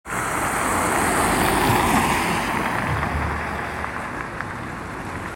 Sound effects > Vehicles
car sunny 04
A recording of a car passing by on Insinöörinkatu 41 in the Hervanta area of Tampere. It was collected on November 12th in the afternoon using iPhone 11. The weather was sunny and the ground was dry. The sound includes the car engine and the noise from the tires on the dry road.
vehicle, engine, car